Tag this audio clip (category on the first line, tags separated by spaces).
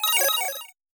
Sound effects > Electronic / Design
game-audio high coin pick-up tonal pitched designed